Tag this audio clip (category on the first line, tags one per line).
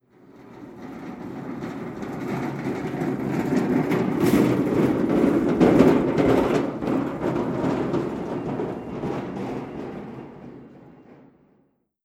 Sound effects > Objects / House appliances
big
cart
pass-by
Phone-recording